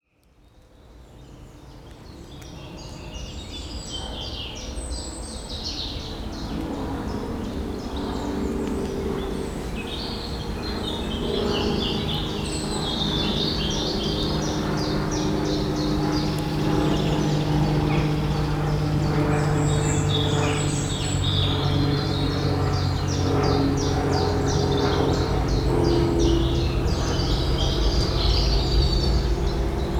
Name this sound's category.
Soundscapes > Nature